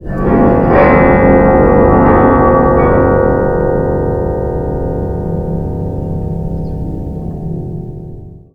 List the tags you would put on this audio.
Soundscapes > Other
dischordant strings storm swells wind

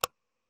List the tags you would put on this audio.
Sound effects > Electronic / Design
game interface ui

